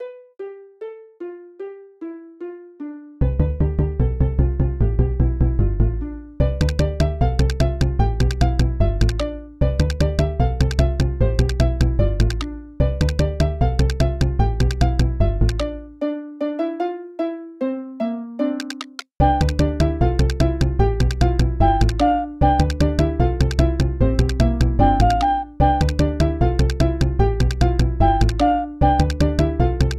Music > Multiple instruments
Stolen Grapes (NoteBlocks)
I would love to know what uses you found for it. Made in NoteBlock studio :-)
Minecraft, Chase, Light-hearted, Fun, Noteblocks, Simple